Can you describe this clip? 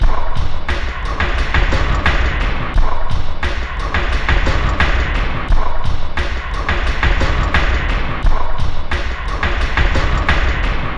Instrument samples > Percussion
This 175bpm Drum Loop is good for composing Industrial/Electronic/Ambient songs or using as soundtrack to a sci-fi/suspense/horror indie game or short film.
Loopable, Drum, Soundtrack, Samples, Dark, Industrial, Loop, Packs, Alien, Underground, Ambient, Weird